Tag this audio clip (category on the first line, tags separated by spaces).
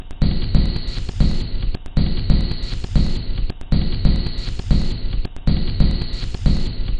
Instrument samples > Percussion
Alien Loopable Weird Ambient Drum Industrial Underground Packs Loop Samples Soundtrack Dark